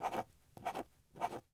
Sound effects > Human sounds and actions
Circling three spots on a piece of paper
Placed my recorder on the table and circled three spots on a piece of paper with a pen, used in a part in-game where the character is told to sign: "here, here, and here." Used in my visual novel: R(e)Born_ Recorded with Sony ICD-UX570, referenced with AKG K240.
scribble, paper, scribbling, pen, writing